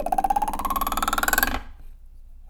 Sound effects > Objects / House appliances
knife and metal beam vibrations clicks dings and sfx-032
Clang, Metal, ding, SFX, metallic, Vibration, Vibrate, Wobble, Perc, ting, Foley, Klang, Beam, Trippy, FX